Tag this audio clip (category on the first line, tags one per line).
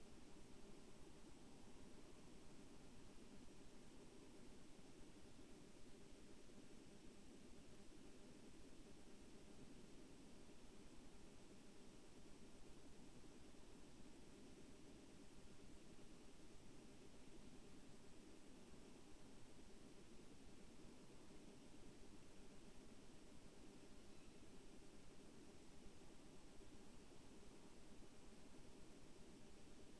Soundscapes > Nature
sound-installation
Dendrophone
weather-data
soundscape
artistic-intervention
raspberry-pi
nature
phenological-recording
data-to-sound
modified-soundscape